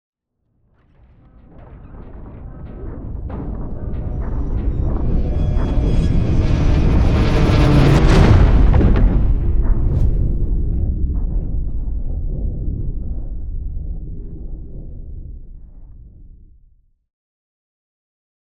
Sound effects > Other
Sound Design Elements SFX PS 064
deep, hit